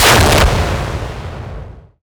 Instrument samples > Percussion
Industrial Hardtechno Kick 4
Stupid sound synthed with phaseplant randomly.